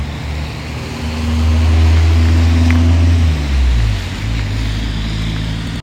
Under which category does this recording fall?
Sound effects > Vehicles